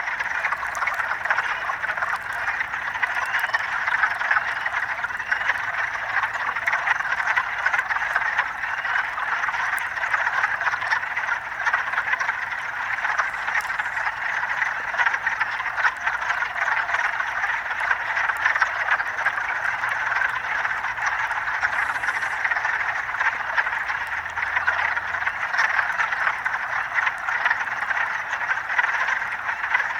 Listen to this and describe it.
Soundscapes > Nature
Frog chorussing in Ontario, Canada in pond April. Most of the noise is made by Wood Frogs (Lithobates sylvaticus or Rana sylvatica) but there are a few Spring Peepers also. When this recording was made (mid April) the Wood Frogs have come out of hibernation, and the males are swimming around the pond and calling for females.
Ontario, wood-frogs, frogs, spring, Field-recording, chorus, binaural, pond